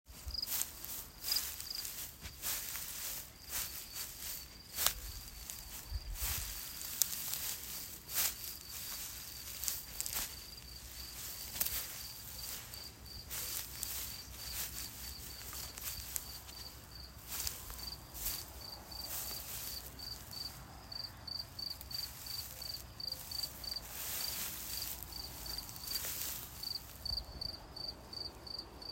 Soundscapes > Nature
Footsteeps on grass and crickets
Date and Time: 16/05/2015 21h08 Venue: Moreira do Lima, Ponte do Lima Sound type: Sound signal – Recorded with the purpose of capturing footsteps on the grass - background sounds characteristic of the natural environment. Type of microphone used: Iphone 14 omnidirectional internal microphone (Dicafone was the application used) Distance from sound sources: I recorded with my phone in my hands while walking, so 1 meter approximately
night, crickets, nature, steeps